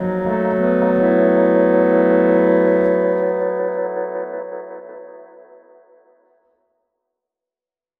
Music > Solo instrument
pump-organ chord